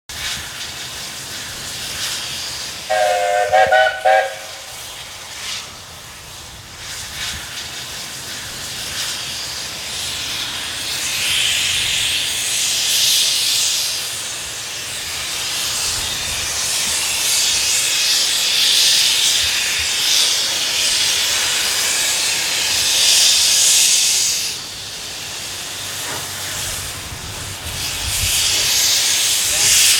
Sound effects > Other mechanisms, engines, machines
Steamtrain start and roll past from station
Umgeni Steam Railway steam loco pulling out of Kloof station in Durban South Africa. Whistle then shunt past and roll on. Can be used in full or lots of different sounds.